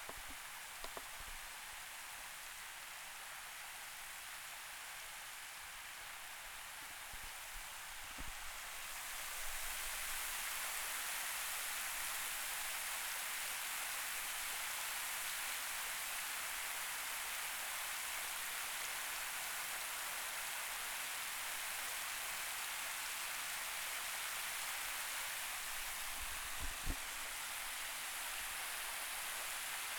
Soundscapes > Nature
A water fountain from different recording angles and various distances.
field-recording, fountain